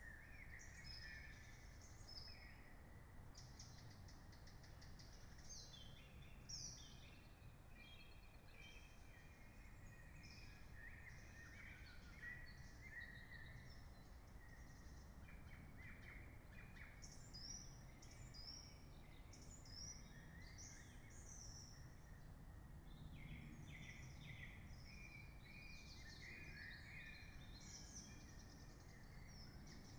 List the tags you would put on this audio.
Soundscapes > Nature
soundscape artistic-intervention field-recording natural-soundscape raspberry-pi data-to-sound alice-holt-forest nature sound-installation weather-data Dendrophone modified-soundscape phenological-recording

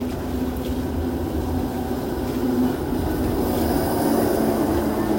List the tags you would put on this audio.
Sound effects > Vehicles
vehicle tram

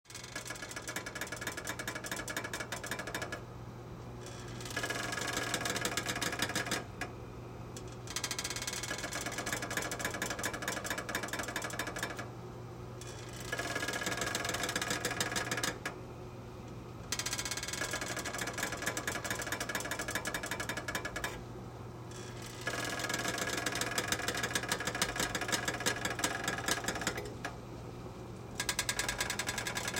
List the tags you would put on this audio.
Sound effects > Objects / House appliances
stove range kitchen metal stovetop heat stress

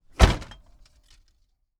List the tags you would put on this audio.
Sound effects > Vehicles
115 2003 2003-model 2025 A2WS August Ford Ford-Transit France FR-AV2 Mono Old Single-mic-mono SM57 T350 Tascam Van Vehicle

